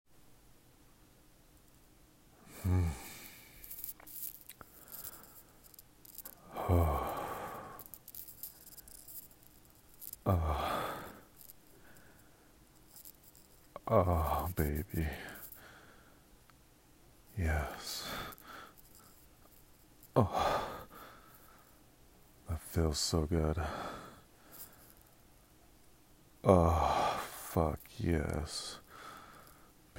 Sound effects > Other
Deep voice man masturbates
Deep voice man touches himself until he orgasms.